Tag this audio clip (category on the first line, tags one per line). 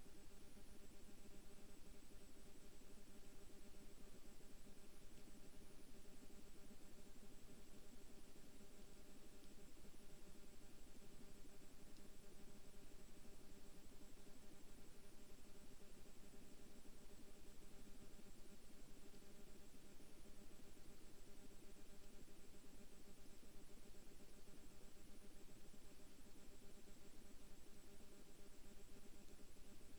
Soundscapes > Nature
alice-holt-forest
artistic-intervention
data-to-sound
Dendrophone
field-recording
modified-soundscape
natural-soundscape
nature
phenological-recording
raspberry-pi
sound-installation
soundscape
weather-data